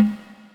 Music > Solo percussion
Snare Processed - Oneshot 173 - 14 by 6.5 inch Brass Ludwig
oneshot, flam, snaredrum, hit, rimshot, realdrums, drumkit, crack, rimshots, brass, percussion, kit, drum, rim, ludwig, sfx, fx, snareroll, roll, beat, realdrum, snares, processed, snare, acoustic, reverb, drums, hits, perc